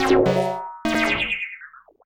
Sound effects > Experimental
Analog Bass, Sweeps, and FX-167
analog, bassy, complex, dark, effect, electronic, machine, retro, robot, robotic, sci-fi, scifi, snythesizer, sweep, synth, vintage, weird